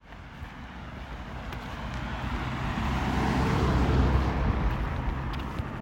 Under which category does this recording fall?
Soundscapes > Urban